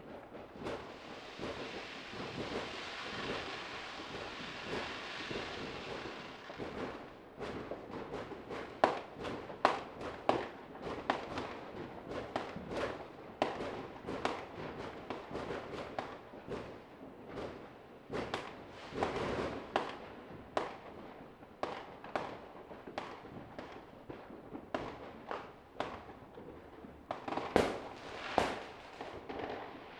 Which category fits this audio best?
Soundscapes > Urban